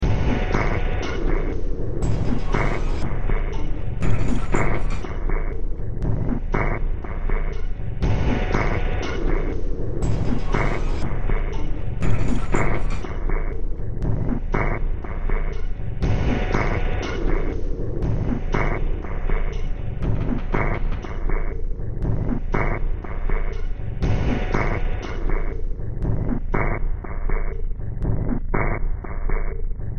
Music > Multiple instruments
Demo Track #3356 (Industraumatic)
Cyberpunk, Games, Noise, Soundtrack, Ambient, Underground, Sci-fi, Industrial, Horror